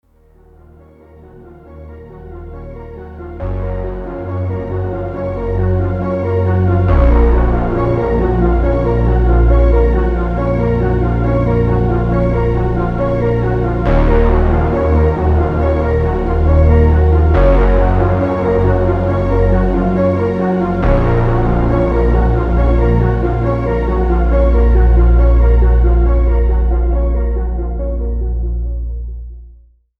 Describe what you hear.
Music > Multiple instruments
Dark 80s sci-fi ambient with eerie tension, perfect for cinematic, retro, and mysterious scenes.